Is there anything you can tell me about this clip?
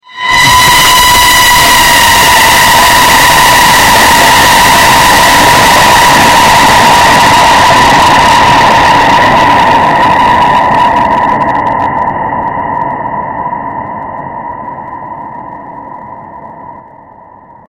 Sound effects > Electronic / Design
Loud Jumpscare 2
Audio, Loud, Sound